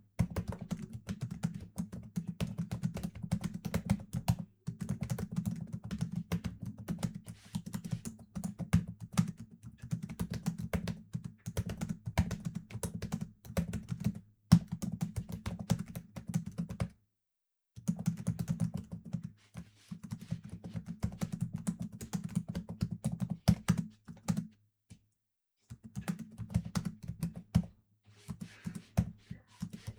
Sound effects > Objects / House appliances
Typing With Long Nails
The sound of someone with a manicure typing on a keyboard.
clicks, laptop, type, fingers, macbook, clicking, typing, nails, mouse, writing, secretary, touchpad, keyboard, computer, click, office